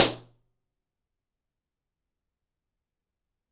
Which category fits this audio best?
Soundscapes > Other